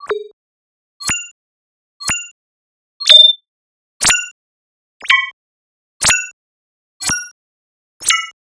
Sound effects > Electronic / Design
High Pitch High Tech UI Clicks
Just some basic user interface sorts of sounds for a sci-fi environment. Made with Serum 2.